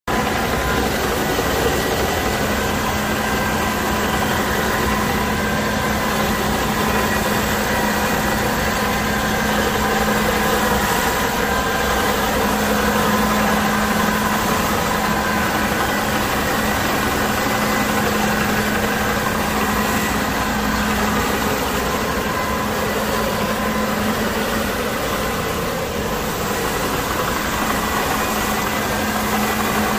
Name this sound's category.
Sound effects > Other mechanisms, engines, machines